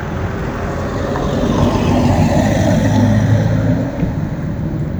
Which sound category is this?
Sound effects > Vehicles